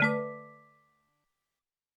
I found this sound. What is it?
Instrument samples > Synths / Electronic
Bim - Generic Notification Sound for Email, Messenger etc.
A generic notification sound I made from a few sounds on a Yamaha DOM-30 module. In my case to simulate the sound effect for an incoming email.
Fx, Instrument, Message, Notification, Sound, Telephone, Tone